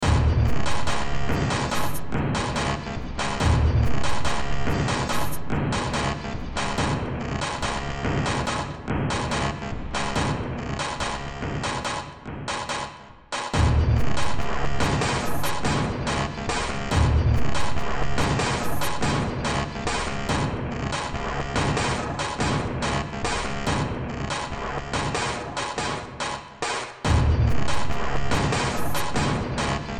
Music > Multiple instruments
Short Track #3906 (Industraumatic)
Ambient, Cyberpunk, Games, Noise, Soundtrack